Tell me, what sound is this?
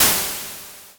Sound effects > Electronic / Design
Matchstick fire being extinguished abruptly, with a clearly telegraphed sizzle. Variation 3 of 3.
extinguish, matchstick, fire, fading, dwindling, snuffed